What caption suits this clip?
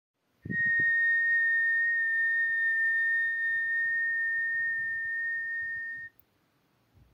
Sound effects > Human sounds and actions
A long human whistle. Sounds more like the wind or some birds...